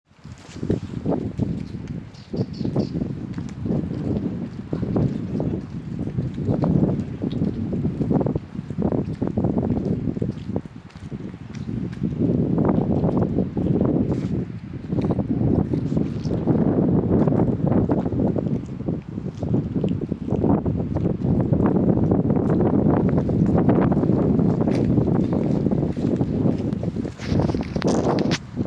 Soundscapes > Nature
bird singing and wind